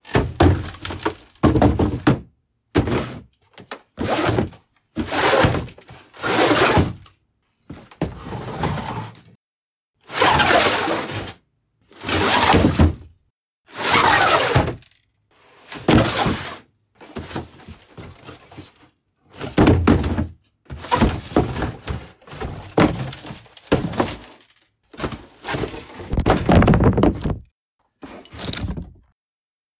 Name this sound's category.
Sound effects > Other